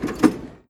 Sound effects > Other mechanisms, engines, machines
MACHMisc-Samsung Galaxy Smartphone Bass Pro Shops RVA-Turnstile Nicholas Judy TDC
A turnstile. Recorded at Bass Pro Shops.